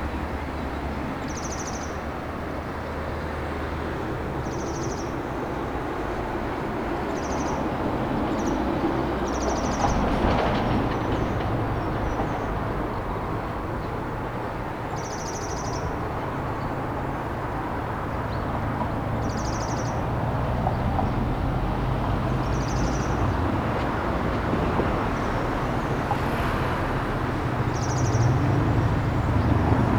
Soundscapes > Urban
Quai de L'agly haut d'escalier vers l'Algy MS Raw - 2025 04 02 08h35 Rivesaltes
Subject : Ambience recording from the top of the stairs at Quai de L'algy. Facing the river. Date YMD : 2025 04 02 Location : Rivesaltes 66600 Pyrénées-Orientales, Occitanie, France. Hardware : Zoom H2N MS RAW mode. Weather : Grey Sky, Little to no wind. Processing : Trimmed and Normalized in Audacity.